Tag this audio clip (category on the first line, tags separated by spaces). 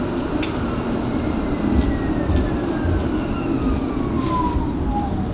Sound effects > Vehicles
stop tram